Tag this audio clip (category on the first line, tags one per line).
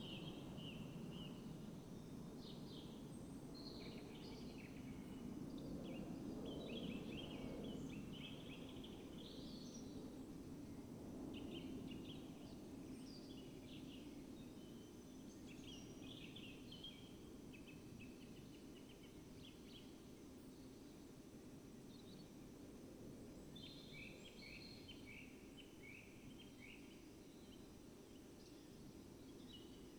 Soundscapes > Nature
data-to-sound
phenological-recording
natural-soundscape
soundscape
field-recording
raspberry-pi
weather-data
Dendrophone
sound-installation
nature
artistic-intervention
modified-soundscape
alice-holt-forest